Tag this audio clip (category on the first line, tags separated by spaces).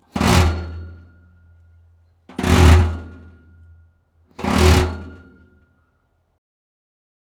Sound effects > Other mechanisms, engines, machines
strum sfx strumming metallic geofone grill metal